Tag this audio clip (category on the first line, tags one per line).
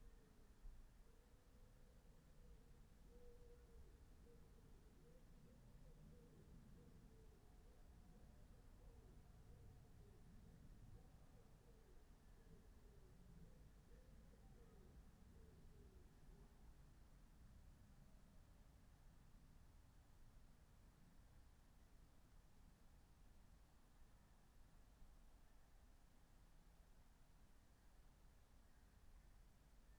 Soundscapes > Nature
weather-data
phenological-recording
natural-soundscape